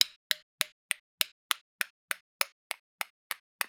Instrument samples > Percussion
MusicalSpoon Medium Single Strike x13
Wood, Slap, Hit, Spoon, Percussion, Strike, Horse, Musical, Gallop, Minimal